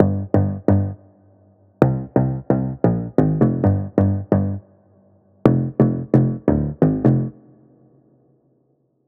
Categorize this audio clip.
Music > Solo instrument